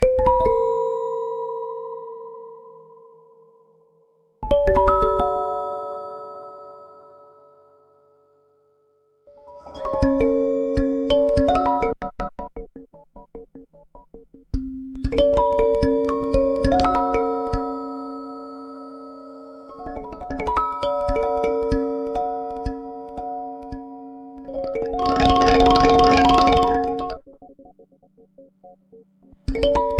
Music > Multiple instruments
Atonal Bouncing Texture #002
Experiments on atonal melodies that can be used as background textures. AI Software: Suno Prompt: experimental, magnetic, atonal, bouncing, boom, reverb, low tones, bells, Mouth Blip Blops, echo, delays, reverb, weird, surprising
ping-pong, glitch, soundscape, reverb, bells, bouncing, atonal, experimental, echo, magnetic, texture, delay, blip-blop, ai-generated